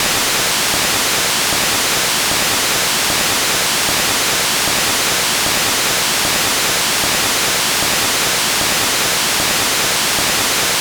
Instrument samples > Synths / Electronic
NOISE Korg Monopoly
Noise Oscillator - Korg Monopoly